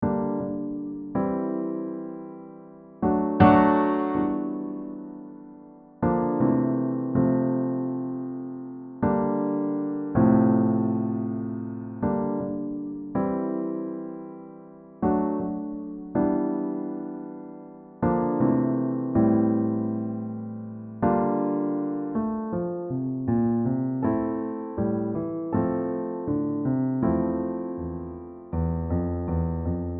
Instrument samples > Piano / Keyboard instruments
piano moods
sad chord progressions loop in A minor recorded in GarageBand at 80bpm
cinematic, GarageBand, midi, NotAI, piano, reflective, sad, solo